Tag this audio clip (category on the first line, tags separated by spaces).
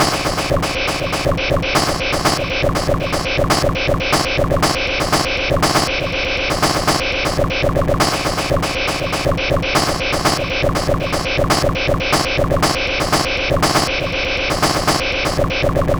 Instrument samples > Percussion
Loop Weird Dark Loopable Soundtrack Samples Alien Industrial Packs Underground Ambient Drum